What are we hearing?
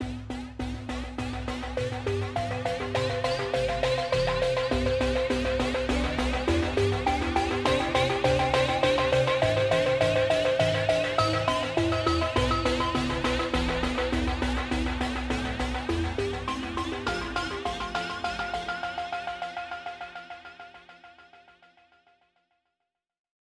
Music > Other
Sci-fi little arp
A little short arp for anything. (Intro's, background music, etc.)